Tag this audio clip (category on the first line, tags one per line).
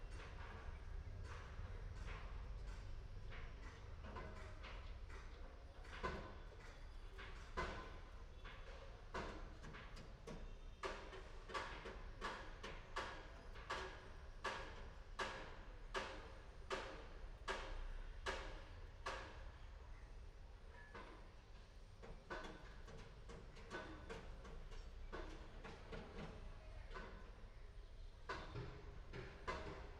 Soundscapes > Urban
afternoon
city
construction
crows
decay
drill
hammer
jackhammer
outdoor
residential
reverb
urban